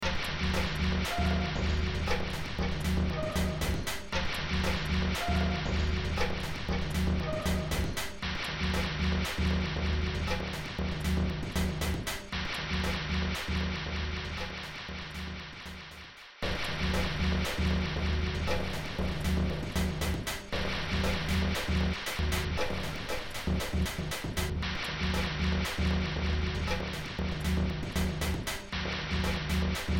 Music > Multiple instruments
Demo Track #3386 (Industraumatic)
Industrial, Underground, Games, Noise, Soundtrack, Sci-fi, Horror, Cyberpunk, Ambient